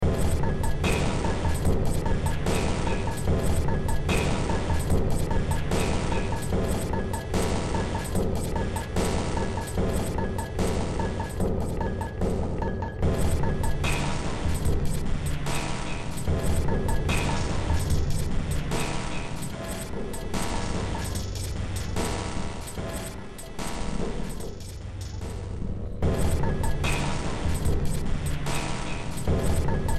Music > Multiple instruments
Short Track #4031 (Industraumatic)
Industrial, Sci-fi, Ambient